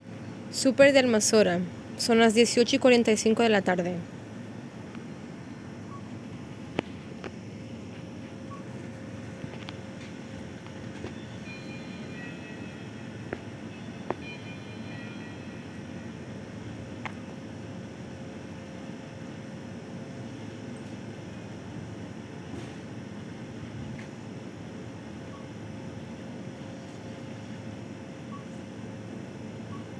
Soundscapes > Indoors
In this recording, you can hear the different sounds of a waiting room at the Almazora Health Center. Recorded on October 17, 2025.